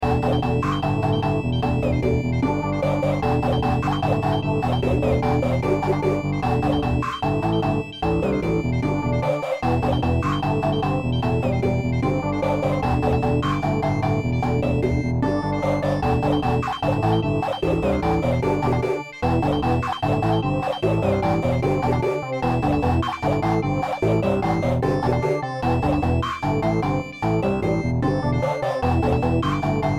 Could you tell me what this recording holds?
Music > Multiple instruments
Wutle (CC 0)
though I dont really think its that good.